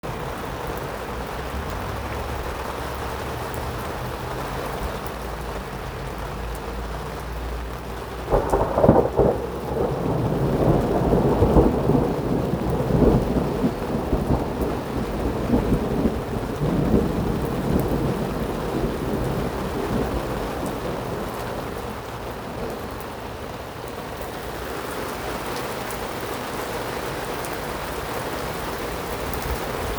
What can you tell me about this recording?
Soundscapes > Nature
Rain under a portico/canopy
Single lightning strike early on, minimal background/wind noise. Steady volume. Recorded in Northern Arizona